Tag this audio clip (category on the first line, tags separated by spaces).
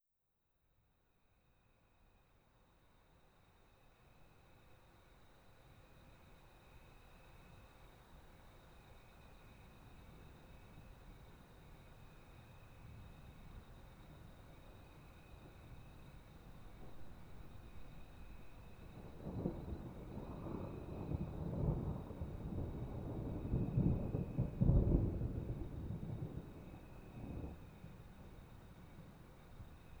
Soundscapes > Nature
field-recording nature night soundscape TascamDR01